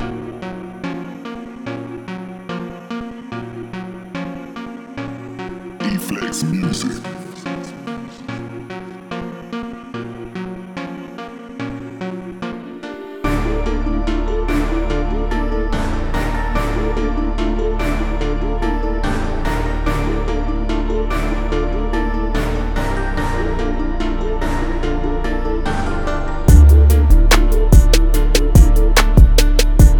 Music > Multiple instruments
Dark Rap/Hip Hop/Trap Club Beat (Loop)
808, bass, beat, club, dark, drum, electro, hard, heavy, hiphop, loop, music, orchestra-hit, pop, rap, synth, trap